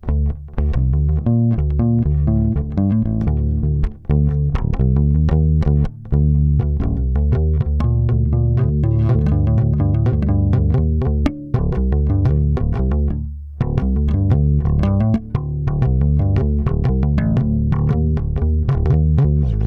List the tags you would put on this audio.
Music > Solo instrument
bass
bassline
basslines
blues
chords
chuny
electric
electricbass
funk
fuzz
harmonic
harmonics
low
lowend
note
notes
pick
pluck
riff
riffs
rock
slap
slide
slides

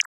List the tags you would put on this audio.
Instrument samples > Percussion
Botanical
Glitch
Organic
EDM
Snap

